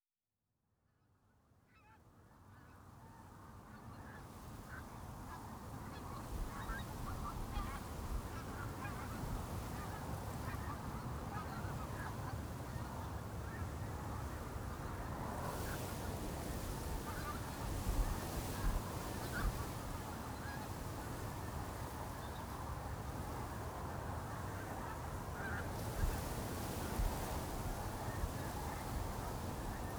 Soundscapes > Nature
Pint Footed Geese, sound collage
Stitched together from two short extracts of a longer recording. A little curlew makes an appearance towards the end. Pink footed geese at dusk roosting at Aberlady Bay. End of Storm Amy. Line Audio CM4s, ORTF. 5th October, 2025.
birds, coast, field-recording, geese